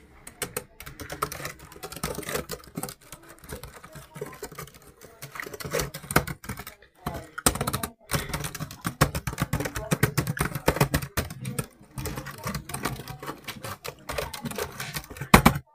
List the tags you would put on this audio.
Sound effects > Objects / House appliances
SFX Laptop Keyboard

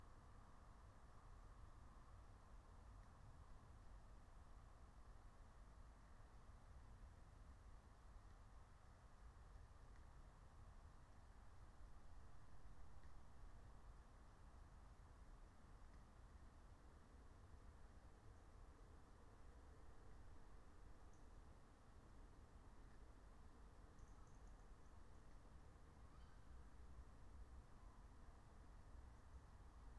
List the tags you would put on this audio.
Soundscapes > Nature
field-recording meadow natural-soundscape nature phenological-recording raspberry-pi soundscape

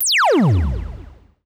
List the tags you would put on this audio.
Sound effects > Electronic / Design
electronic laser pew sci-fi sfx shoot shot sound-design ui weapon woosh

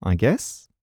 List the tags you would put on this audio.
Speech > Solo speech
MKE600 hesitant Generic-lines Voice-acting mid-20s FR-AV2 I-guess Shotgun-mic Hypercardioid MKE-600 Adult Shotgun-microphone VA Tascam 2025 Sennheiser Calm july Single-mic-mono Male

